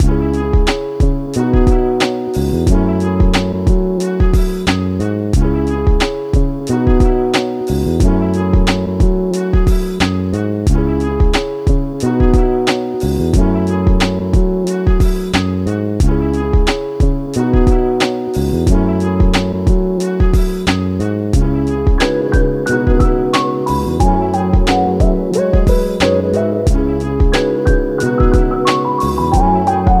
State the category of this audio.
Music > Multiple instruments